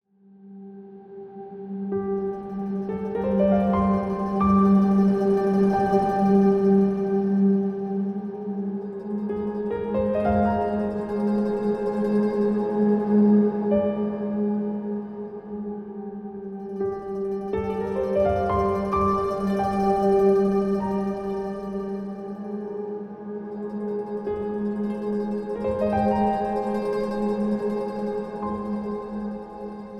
Music > Multiple instruments
Whisper of the Wind (Quieter)
But what do YOU hear?
contemplative-piano, conteplative-atmosphere, cotemplative-piano-chords, deep-meditaion, gentle-breeze, gentle-piano-arpeggios, gentle-piano-chords, meditation, meditation-music, meditative-ambience, meditative-jingle, meditative-music, meditative-music-loop, musical-nostalgia, nostalgic-ambience, nostalgic-piano, nostalgic-piano-arpeggios, nostalgic-piano-chords, nostalgic-vibes, nostlagic-music, peaceful-meditation, peaceful-piano-arpeggios, peaceful-piano-chords, piano-arpeggesio, reflective-piano, reflective-piano-chords, sacred-meditation, soothing-piano, soothing-piano-chords